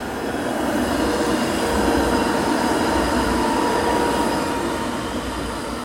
Sound effects > Vehicles
A recording of a tram passing by on Insinöörinkatu 30 in the Hervanta area of Tampere. It was collected on November 7th in the afternoon using iPhone 11. There was light rain and the ground was slightly wet. The sound includes the whine of the electric motors and the rolling of wheels on the wet tracks.